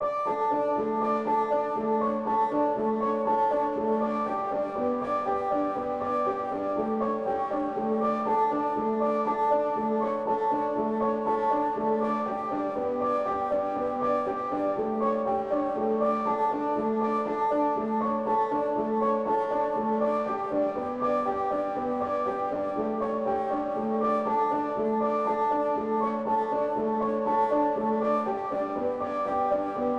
Music > Solo instrument
Piano loops 198 efect 3 octave long loop 120 bpm
loop, simplesamples, pianomusic, simple, 120, free, music, reverb, piano, samples, 120bpm